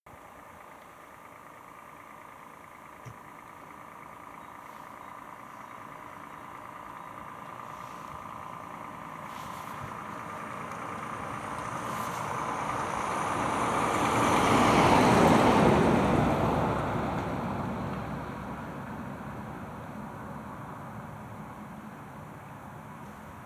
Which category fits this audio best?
Sound effects > Vehicles